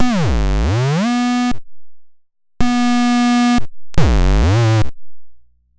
Sound effects > Electronic / Design
Optical Theremin 6 Osc dry-004
SFX, Robotic, Theremins, Bass, Noise, Experimental, noisey, Dub, Handmadeelectronic, Trippy, Electro, Analog, Sweep, Digital, DIY, Scifi, Electronic, Glitchy, Otherworldly, Infiltrator, Glitch, Synth, FX, Optical, Instrument, Theremin, Sci-fi, Robot, Spacey, Alien